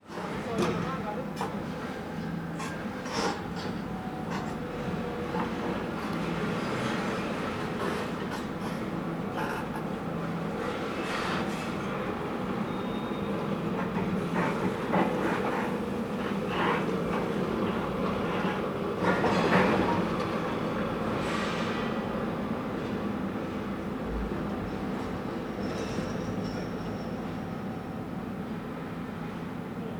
Soundscapes > Urban
Splott - Light Industrial Sounds Men Shouting Metal Clanging - Seawall Road
splott, wales, fieldrecording